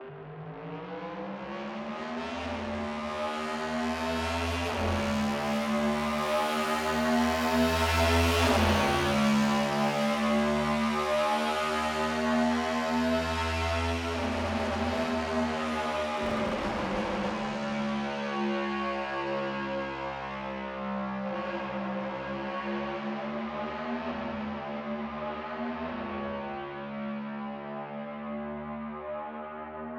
Sound effects > Electronic / Design
Car vroom far away
This sound was made and processed in DAW; -- Revving engine at a distance... - Made this with kicks that play very quickly at a given piston firing order of an engine. Distortion, automated reverb and voilà - you get this. At 0:15 or something like that, i added another section of kicks for a realistic car sound. - Use it everywhere and anywhere you want. -- Ы.
car, distant, drive, engine, far, fly-by, motor, passing-by, race, race-car, revving, vroom